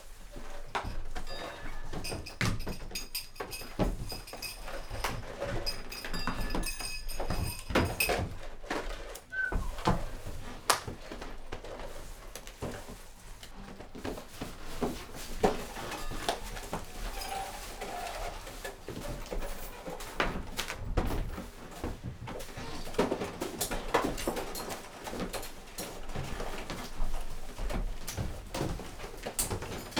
Sound effects > Objects / House appliances
People interaction noise bus
A series of me recording multiple takes in a medium sized bedroom to fake a crowd. Clapping/talking and more original applause types, at different positions in the room. Recorded with a Rode NT5 XY pair (next to the wall) and a Tascam FR-AV2. You will find most of the takes in the pack.
object, crowd, XY, indoor, noise, solo-crowd, FR-AV2, NT5, silent, processed, mixed, Tascam, Rode